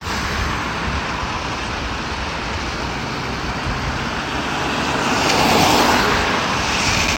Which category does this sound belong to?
Sound effects > Vehicles